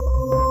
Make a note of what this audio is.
Sound effects > Electronic / Design
Digital interface SFX created using in Phaseplant and Portal.
alert, confirmation, digital, interface, message, selection